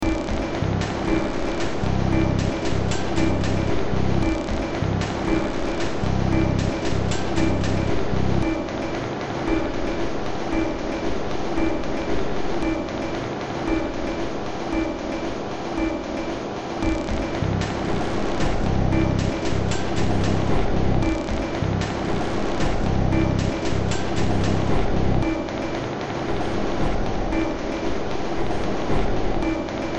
Music > Multiple instruments
Demo Track #3282 (Industraumatic)
Ambient, Cyberpunk, Games, Horror, Noise, Soundtrack, Underground